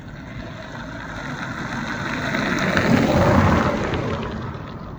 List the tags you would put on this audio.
Sound effects > Vehicles
car
automobile
vehicle

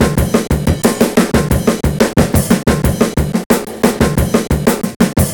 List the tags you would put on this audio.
Music > Other
quantized
groovy
percussion-loop
drumloop
jungle
break
drums
breakbeat

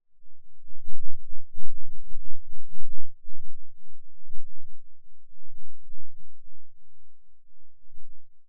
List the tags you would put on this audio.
Sound effects > Experimental
2025
FR-AV2
frequency
low-frequency
Rode
rumble
SFX
Tasam

